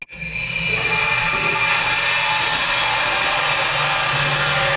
Sound effects > Experimental
Glitch Percs 2 rev phader
impact, otherworldy, perc, experimental, sfx, zap, glitch, glitchy, snap, edm, idm, hiphop, impacts, pop, fx, whizz, lazer, alien, crack, abstract, clap, percussion, laser